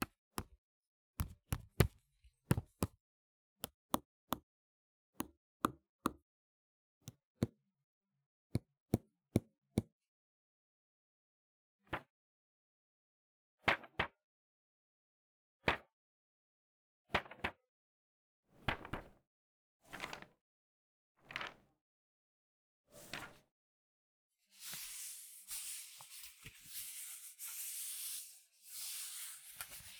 Sound effects > Objects / House appliances
Tapping and passing finger through a paper sheet. Foley recording created for an animated short. Gear: Zoom H4n Mic: Sennheiser MKH50